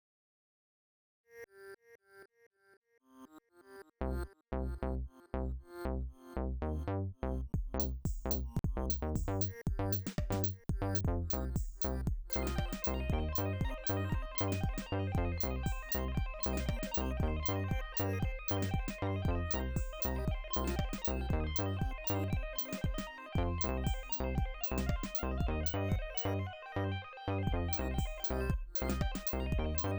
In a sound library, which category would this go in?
Music > Multiple instruments